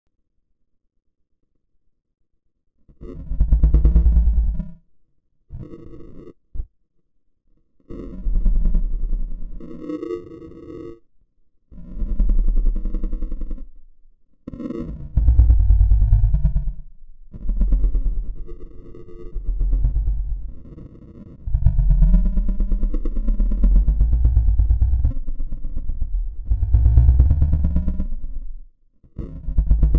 Sound effects > Animals
Der Paroop - Growls
This just sounds like a dinosaur or something else growling over and over.
dinosaur, growl, horror, monster, weird